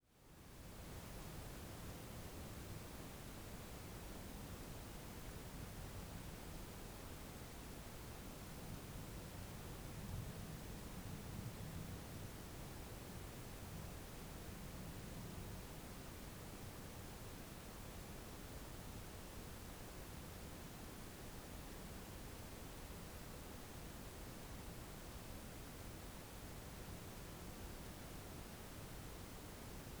Sound effects > Other
Relatively quiet room atmosphere. The Zoom H2essential recorder was used to record this sound.